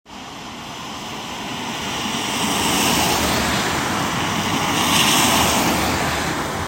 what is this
Sound effects > Vehicles
The sound of a car/cars passing. Recorded in Tampere on iPhone 14 with the Voice Memos app. The purpose of recording was to gather data from vehicles passing by for a binary sound classifier.